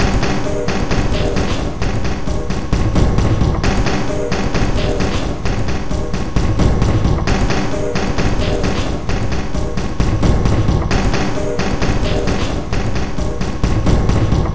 Instrument samples > Percussion
This 132bpm Drum Loop is good for composing Industrial/Electronic/Ambient songs or using as soundtrack to a sci-fi/suspense/horror indie game or short film.
Alien, Ambient, Dark, Drum, Industrial, Loop, Loopable, Packs, Samples, Soundtrack, Underground, Weird